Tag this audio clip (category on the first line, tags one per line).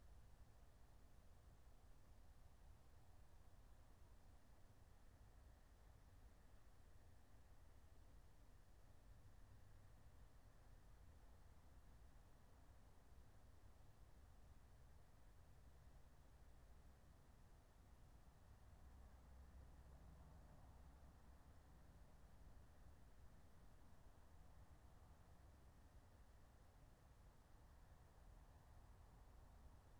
Soundscapes > Nature
alice-holt-forest
field-recording
natural-soundscape
nature
raspberry-pi
soundscape